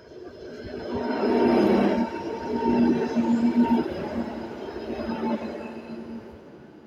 Sound effects > Vehicles
city tram
tram, transportation, vehicle